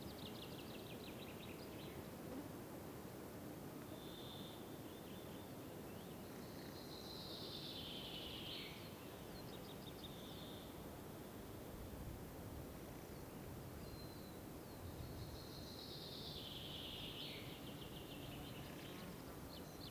Soundscapes > Nature
Quiet forest ambience recorded
Recorded with MKH8040 and MixPRE 6 II. Looking for feedback because to me this sounds very hissy. Is it something wrong with my gear or setup or this the best this setup can do? Thanks!